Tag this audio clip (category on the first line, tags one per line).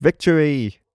Speech > Solo speech
Shotgun-microphone
Sennheiser
MKE600
Generic-lines